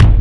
Instrument samples > Percussion
This is the correct version.